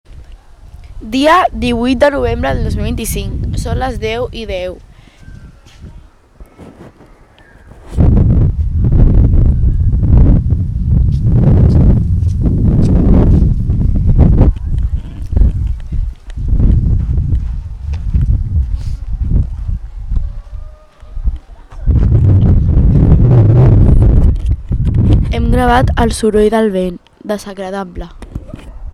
Soundscapes > Urban
20251118 CasaBloc BrianSara
Ambience, SoundMap, Urban